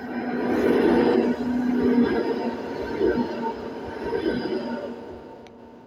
Sound effects > Vehicles
city tram
A tram driving by
transportation, tramway, vehicle, tram